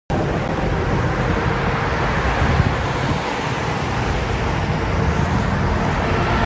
Sound effects > Vehicles
Sun Dec 21 2025 (14)
Car passing by in highway